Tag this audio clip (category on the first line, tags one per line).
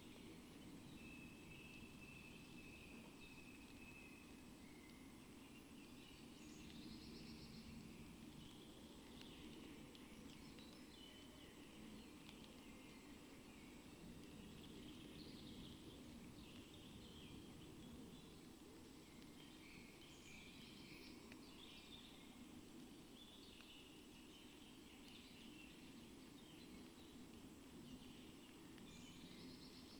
Soundscapes > Nature
alice-holt-forest
artistic-intervention
data-to-sound
Dendrophone
natural-soundscape
nature
sound-installation
soundscape
weather-data